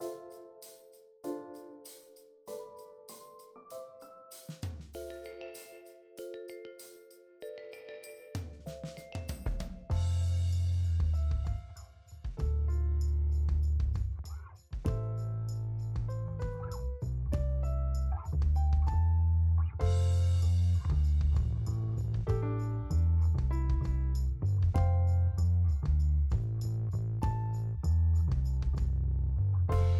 Music > Multiple instruments
KeysBassDrums@97bpm
Some noise I cooked up. Some live instruments recorded through Headrush MX5, and other parts assembled in Logic Pro. Steal any stems. Or if you're trying to make music, hit me up! I'll actually make something of quality if you check out my other stuff.
drums, f